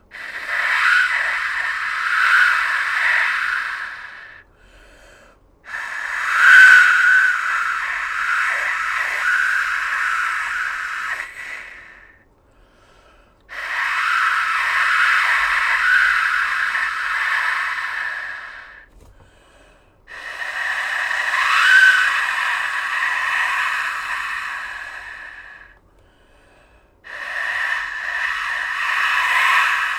Soundscapes > Nature
Wind whistling. Simulated using an Acme Windmaster.